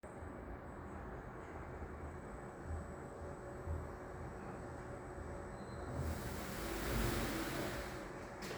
Soundscapes > Indoors
Sound of the lift doors opening. Recorded with the mobile phone.
door, opening